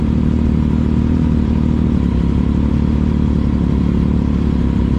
Sound effects > Other mechanisms, engines, machines
Description (Motorcycle) "Motorcycle Idling: distinctive clicking of desmodromic valves, moving pistons, rhythmic thumping exhaust. High-detail engine textures recorded from close proximity. Captured with a GoPro Hero 4 on the track at Alastaro. The motorcycle recorded was a Ducati Supersport 2019."